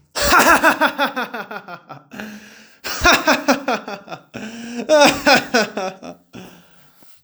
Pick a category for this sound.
Speech > Other